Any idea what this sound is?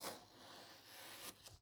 Sound effects > Other
Long slice vegetable 3
Chef, Chief, Cook, Cooking, Cut, Home, Indoor, Kitchen, Knife, Slice, Vegetable